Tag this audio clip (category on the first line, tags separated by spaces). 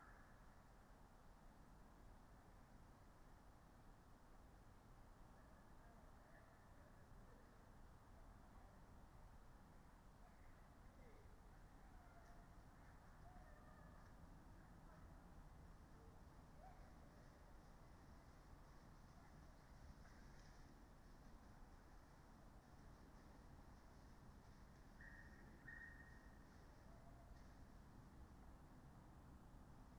Soundscapes > Nature
alice-holt-forest weather-data soundscape data-to-sound natural-soundscape artistic-intervention Dendrophone sound-installation phenological-recording field-recording modified-soundscape